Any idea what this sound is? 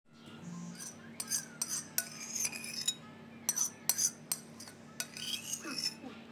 Sound effects > Objects / House appliances
Ceramic Plate and Spoon Scratching While Eating
A realistic sound of a metal spoon scratching gently against a ceramic plate while eating. Captures the subtle scraping and clinking noises typically heard during a meal. Ideal for use in cooking scenes, ASMR videos, restaurant ambience, or any food-related audio project.